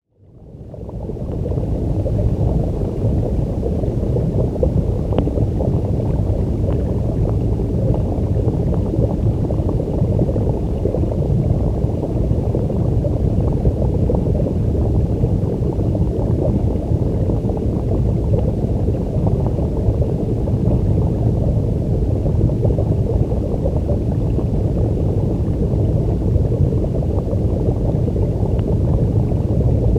Soundscapes > Nature
Small Creek Runs over Sand Bank
A gentle geophone recording of a small creek running over a tiny shore of small pebbles. Recorded using LOM Geophone Zoom F3 recorder